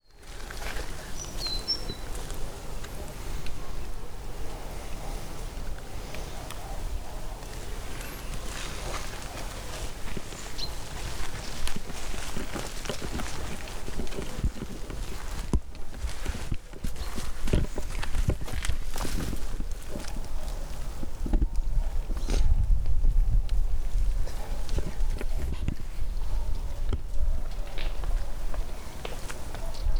Soundscapes > Nature
20250312 Parcdecollserola Lake Birds Dogs Quiet Chill GreatTit
Chill, Lake, Birds, Dogs, ParcCollserola, Quiet, GreatTit